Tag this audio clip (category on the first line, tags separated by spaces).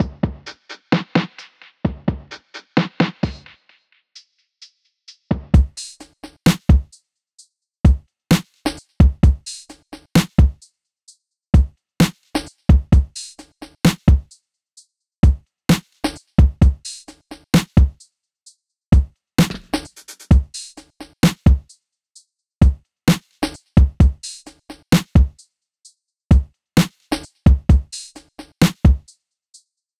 Instrument samples > Percussion
drum percussion drums